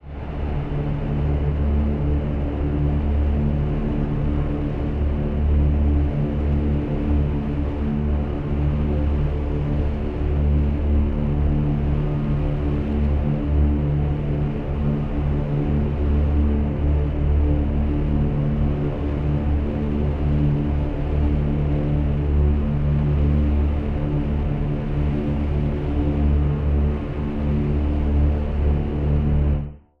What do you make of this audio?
Instrument samples > Synths / Electronic
Multi-layered drone sound using some strings, samples, and effects.
design, atmosphere, soundscape, atmospheric, space